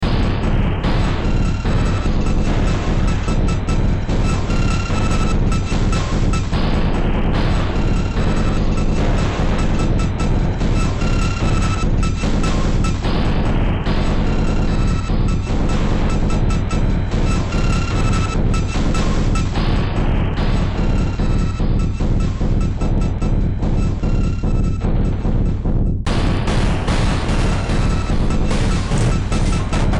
Music > Multiple instruments
Demo Track #3710 (Industraumatic)
Ambient, Soundtrack, Cyberpunk, Horror, Underground, Games, Sci-fi, Noise, Industrial